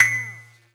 Sound effects > Electronic / Design
Clip's empty! This metallic ping is inspired by the famous garand ping. Variation 1 of 4.